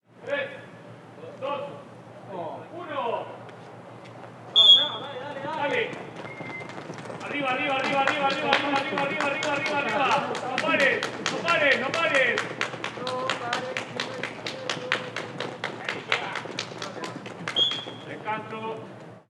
Soundscapes > Urban
Entrenamiento Valparaiso
Vocal sound of the voice and whistle of a sports coach. On the back we can hear people running for a short span of time.
America, Chile, field, recording, South, sports, training, Valparaiso